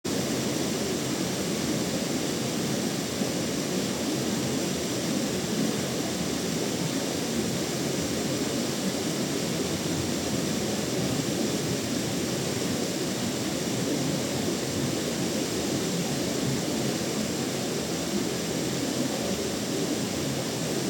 Soundscapes > Nature
Waterfall River Capdella (Spain)
Medium waterfall in the Cabdella River.
river, liquid